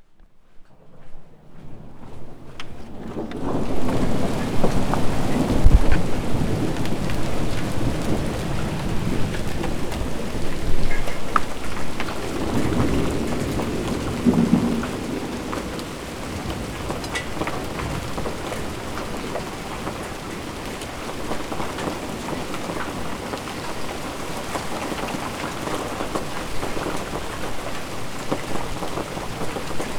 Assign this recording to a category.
Soundscapes > Nature